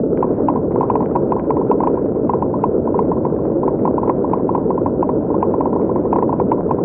Sound effects > Objects / House appliances
Boiling Water2(Pink Noise Paded)
Hi ! That's not recording sound :) I synth it with phasephant!
Boiling, Bubble, Water